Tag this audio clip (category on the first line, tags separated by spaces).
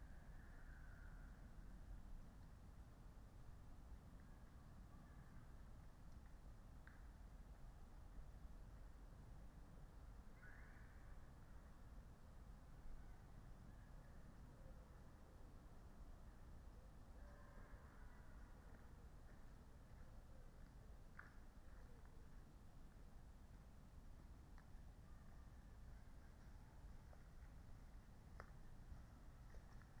Soundscapes > Nature
artistic-intervention sound-installation raspberry-pi Dendrophone alice-holt-forest modified-soundscape natural-soundscape nature field-recording phenological-recording soundscape data-to-sound weather-data